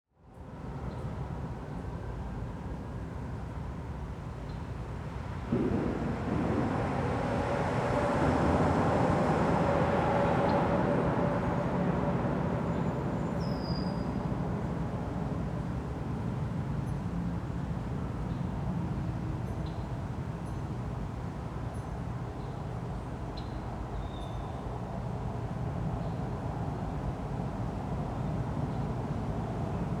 Sound effects > Human sounds and actions
Under the Bridge - Cars, Trains, Birds, Wind, Rain
atmos, wind, cars, atmospheric, white-noise, atmosphere, ambiance, soundscape, atmo, bridge, urban, rain, ambient, trains, background, general-noise, ambience, noise, traffic, field-recording, city